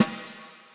Music > Solo percussion
Snare Processed - Oneshot 221 - 14 by 6.5 inch Brass Ludwig
perc, snare, hit, realdrum, beat, acoustic, reverb, rimshot, crack, oneshot, flam, ludwig, drumkit, percussion, brass, drum, fx, processed, drums, hits, realdrums, rim, sfx, kit, rimshots, snaredrum, roll, snareroll, snares